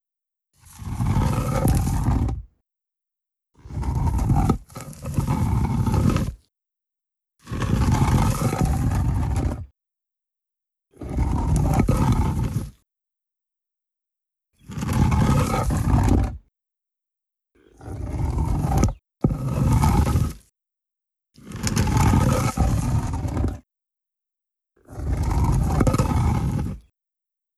Sound effects > Other
Stone muted - Scraping with grass
Granular stone pushed onto a stone and grass surface. * No background noise. * No reverb nor echo. * Clean sound, close range. Recorded with Iphone or Thomann micro t.bone SC 420.